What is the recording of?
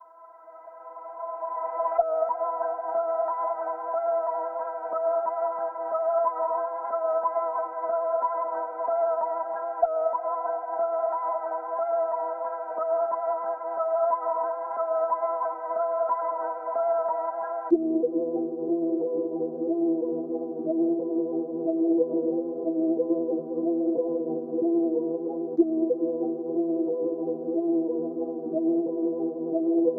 Music > Multiple instruments
NOIZE 122BPM (prod.
1lovewav, ambient, analog, eerie, loop, muisc, synth, trap